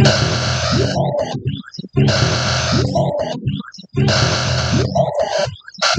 Sound effects > Electronic / Design
Stirring The Rhythms 10
noise-ambient, PPG-Wave, content-creator, dark-design, weird-rhythm, wonky, drowning, sound-design, dark-techno, scifi, noise, sci-fi, dark-soundscapes, rhythm, vst, science-fiction, glitchy-rhythm